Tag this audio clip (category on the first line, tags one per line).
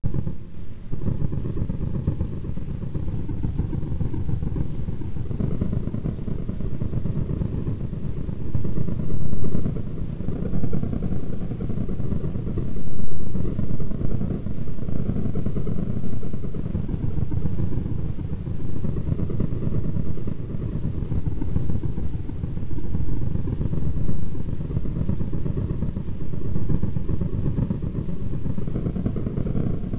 Sound effects > Experimental
reverb
experimental
Cricket
tape-loop